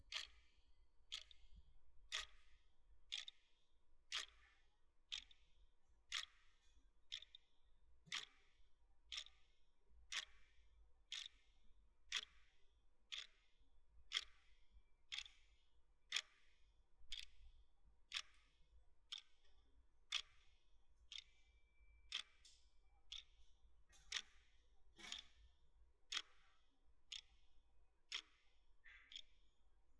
Sound effects > Objects / House appliances
Clock Ticking(echo)
Clock ticking with a slight echo. Recorded with Rode NT1a microphone.
Ticking, Tick, Clock